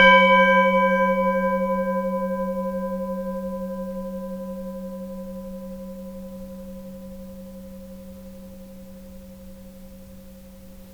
Instrument samples > Other
tibetan bowls Recorded with sounddevices mixpre with usi microphones